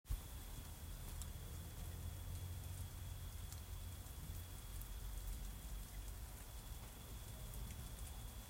Sound effects > Animals
020525 2240 ermesinde grilos telefone 011
Friday, May 2nd Around 10:40 pm In Ermesinde Rainy ambiance with crickets in the background (ss-n, fx-n) Cell phone microphone Recorded outdoors, far from the sound source 020525_2240_ermesinde_crickets_telephone_01